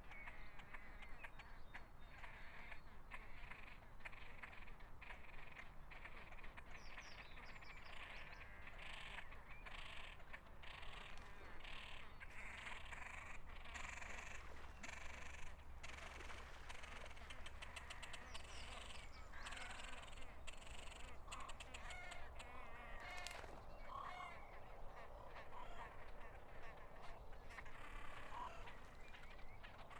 Soundscapes > Nature
AMBBird Great crested grebes nesting and many other birds, in the reeds by a forest, Lindesnäs, Sweden
Recorded 10:10 09/05/25 Along the shore there’s maybe 20 nests made of old reed. Grebes sit in them and swim around, making different noises. However there’s about 10 different other birds heard less prominently in the recording, (including blue tits, different gulls, coots, reed buntings, starlings, geese, blackcaps, and chiffchaffs). In the beginning a train passes and a person walks past in a call, otherwise nothing but birds. Zoom H5 recorder, track length cut otherwise unedited.
Ambience, Black-headed, Bunting, Crested, Forest, Grebe, Gull, Karlskrona, Morning, Nesting, Reeds, Seagull, Sweden, Water